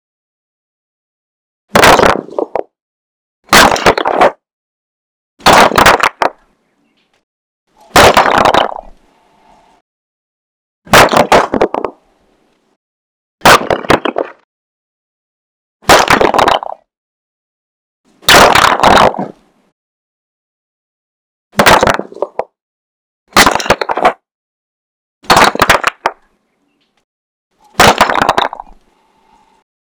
Sound effects > Natural elements and explosions
rock impact sounds distorted 01032026
sounds of rock stone destoryed sounds or impact sounds. I used audaciity with distortion effects applied.